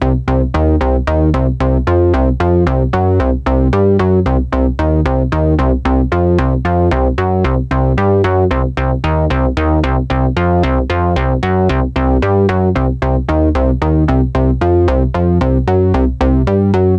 Music > Solo instrument
113 C# MC202-Bass 02
Analog, Analogue, BassSynth, Electronic, Loop, Roland, Synth